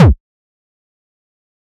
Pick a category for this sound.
Instrument samples > Percussion